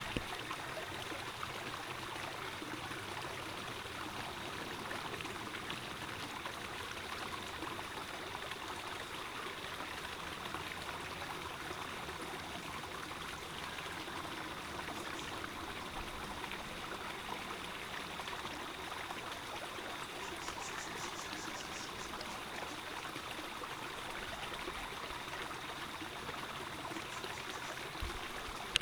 Soundscapes > Nature
River/Creek Water Ambience
Sorry for the handling noises at the start and end of the clip! This clip was recorded with a Zoom H1N microphone. There are a few different bird calls through the clip, but of course the focus is the creek. This is specifically the sound of a tiny little waterfall, but hopefully it has many diverse water-based uses.
Creek, Bubbling, Birds, Water, Stream, Brook, River